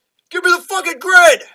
Speech > Solo speech
This is just a test